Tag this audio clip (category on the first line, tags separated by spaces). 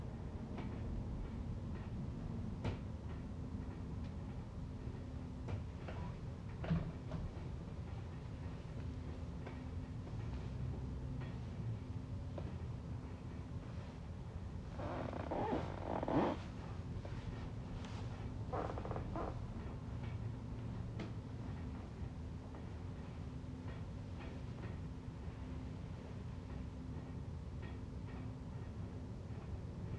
Soundscapes > Indoors
field-recording noise